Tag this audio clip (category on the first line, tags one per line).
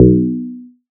Instrument samples > Synths / Electronic
additive-synthesis
fm-synthesis
bass